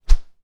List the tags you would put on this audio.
Sound effects > Objects / House appliances
Plastic
Whoosh

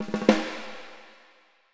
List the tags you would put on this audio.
Music > Solo percussion
drums
hits
ludwig
percussion
reverb
rimshots
snaredrum

